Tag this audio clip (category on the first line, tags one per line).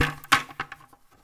Sound effects > Other mechanisms, engines, machines
little
thud
sfx
boom
knock
tink
strike
crackle
bam
bang
metal
pop
sound
rustle
wood
oneshot
percussion
foley
tools
bop
perc
fx
shop